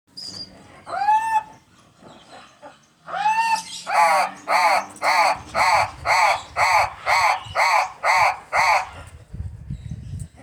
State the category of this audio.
Sound effects > Animals